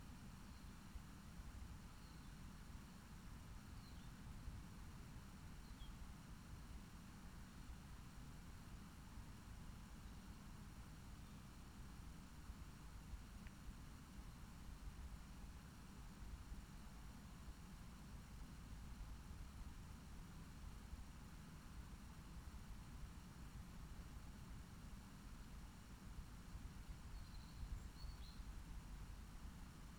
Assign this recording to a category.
Soundscapes > Nature